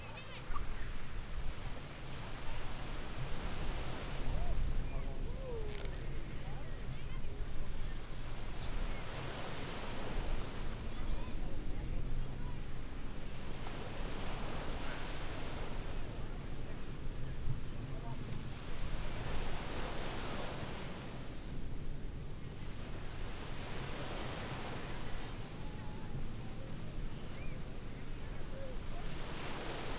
Soundscapes > Urban
AMBSea-Summer Public Beach, waves, kids playing Noon QCF Gulf Shores Alabama Zoom H3VR
The Public Beach in Gulf Shores, Alabama, 12Noon. Adults talking, kids playing, wind, waves, surf.
kids-playing, waves